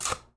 Sound effects > Objects / House appliances
A styrofoam tear.